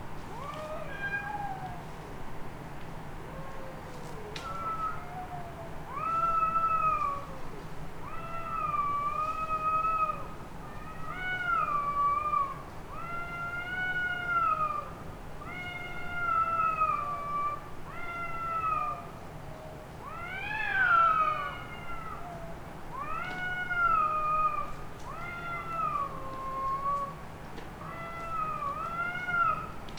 Sound effects > Animals
Cat chorus recorded by an overnight drop rig in Aroumd, Morocco. The equipment used was a pair of Primo EM272s into a Zoom F3. Recorded early morning 13th May, 2025. The sound of a nearby river (Oued Rheraya) is also heard (this is not machine hiss). The brief performance is brought to an abrupt end when someone opens a creaking door.